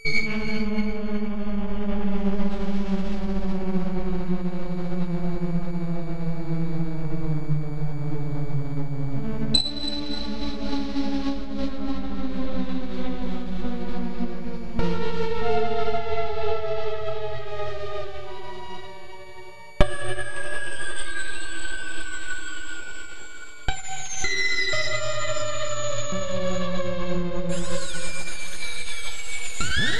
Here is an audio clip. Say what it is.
Sound effects > Electronic / Design
Ghost Shine 1
noise, abstract, ambient, creative, noise-ambient, commons